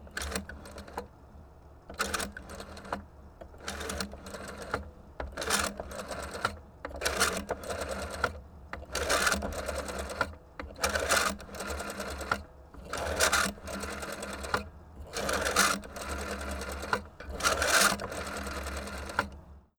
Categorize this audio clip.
Sound effects > Objects / House appliances